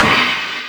Instrument samples > Percussion
• digitally low-pitched crash: 16" (inches) Sabian HHX Evolution Crash • attack 1 octave lower and attenuated the 16" (inches) Sabian HHX Evolution Crash